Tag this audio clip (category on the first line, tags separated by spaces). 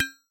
Sound effects > Objects / House appliances
sampling percusive recording